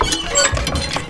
Sound effects > Other mechanisms, engines, machines
Handcar aka pump trolley, pump car, rail push trolley, push-trolley, jigger, Kalamazoo, velocipede, gandy dancer cart, platelayers' cart, draisine, or railbike sound effect, designed. Second push sound of a total of 4. Can be used in sequence in1-out1-in2-out2 or in1/out1 can be randomly swapped with in2/out2.